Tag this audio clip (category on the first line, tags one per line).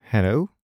Speech > Solo speech
mid-20s
Generic-lines
Adult
Sennheiser
VA
MKE600
Calm
MKE-600
Hello
Single-mic-mono
Shotgun-mic
Greetings
Tascam
greeting
july
Voice-acting
Shotgun-microphone
FR-AV2
Hypercardioid
Male
2025